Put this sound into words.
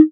Instrument samples > Synths / Electronic
CAN 8 Eb
fm-synthesis, bass